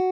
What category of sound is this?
Instrument samples > String